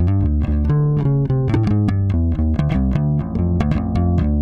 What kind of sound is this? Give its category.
Music > Solo instrument